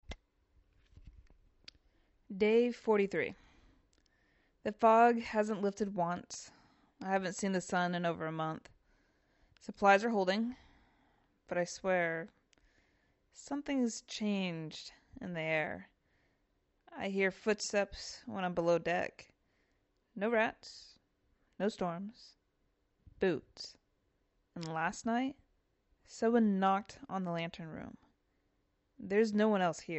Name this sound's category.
Speech > Solo speech